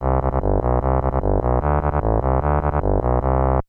Music > Solo instrument
Made in furnace tracker.